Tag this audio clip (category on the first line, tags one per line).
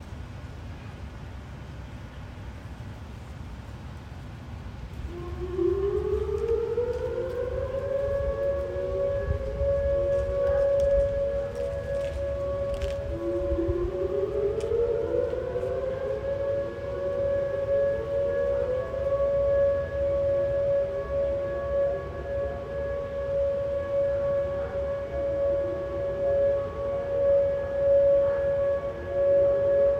Sound effects > Other mechanisms, engines, machines
city
disaster
drill
siren
test
tornado
warning